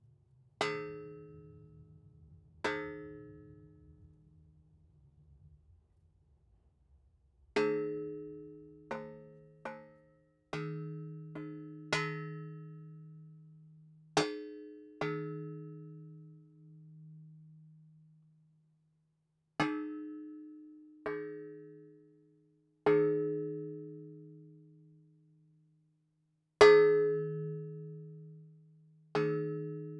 Sound effects > Objects / House appliances
pinging a shovel Recorded with zoom H2n, edited with RX